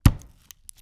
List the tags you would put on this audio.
Sound effects > Experimental
foley
bones
vegetable
thud
punch
onion

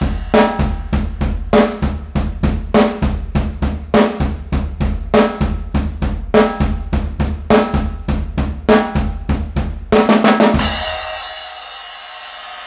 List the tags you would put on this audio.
Instrument samples > Percussion
acoustic
drum
drum-loop
drums
garbage
groovy
hh
hihats
hit
improvised
loop
percs
percussion
percussion-loop
percussive
samples
snare
solo
sticks

